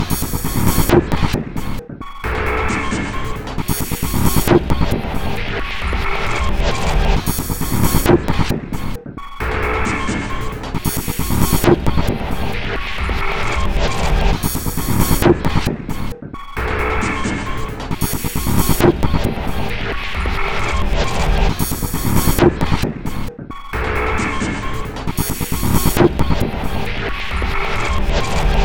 Instrument samples > Percussion
This 67bpm Drum Loop is good for composing Industrial/Electronic/Ambient songs or using as soundtrack to a sci-fi/suspense/horror indie game or short film.
Underground, Loop, Samples, Soundtrack, Drum, Industrial, Dark, Ambient